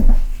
Sound effects > Other mechanisms, engines, machines
shop foley-003
fx, shop, strike, sfx, pop, wood, knock, little, crackle, thud, tink, bam, boom, foley, tools, bang, perc, rustle, oneshot, metal, sound, percussion, bop